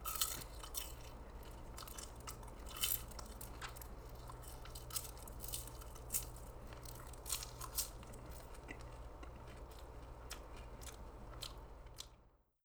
Sound effects > Human sounds and actions
FOODEat-Blue Snowball Microphone Kettle Corn Nicholas Judy TDC
Eating kettle corn.
eat, human, Blue-brand, Blue-Snowball, kettle-corn, foley